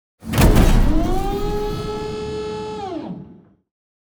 Sound effects > Other mechanisms, engines, machines
Sound Design Elements-Robot mechanism-014
design, clanking, operation, mechanism, processing, powerenergy, synthetic, feedback, motors, whirring, robotic, grinding, gears, actuators, servos, movement, digital, clicking, circuitry, robot, metallic, automation, elements, sound, hydraulics, mechanical, machine